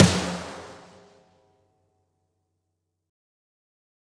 Instrument samples > Percussion
Snare to God
One shot sample of 14x8" DW Performance Series Snare Drum!
drum, drums, oneshot, sample, snare